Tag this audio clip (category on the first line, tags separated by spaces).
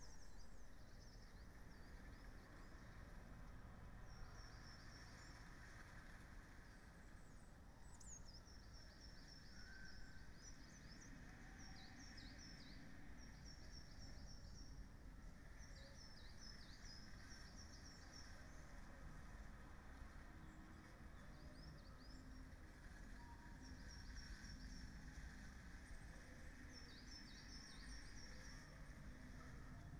Soundscapes > Nature
alice-holt-forest natural-soundscape data-to-sound weather-data Dendrophone